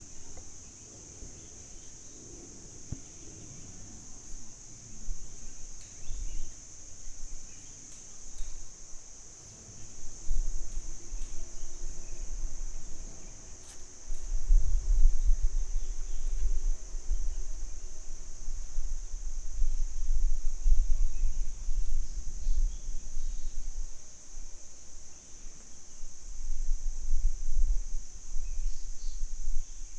Soundscapes > Nature
Forest Ambience, Monk’s Trail, Chiang Mai, Thailand (March 7, 2019)
This is a field recording captured along the Monk’s Trail in Chiang Mai, Thailand, on March 7, 2019. The soundscape includes natural forest ambience with birdsong, insects and rustling leaves.
ambience, birds, Chiang, field, insects, jungle, Mai, monk, nature, recording, Thailand, tropical